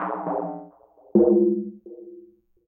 Sound effects > Experimental
Analog Bass, Sweeps, and FX-147
vintage, dark, synth, trippy, analogue, weird, robot, machine, bass, sci-fi, sfx, scifi, alien, electronic, bassy, pad, analog, mechanical, electro, snythesizer, fx, basses, retro, complex, robotic, oneshot, sweep, korg, sample, effect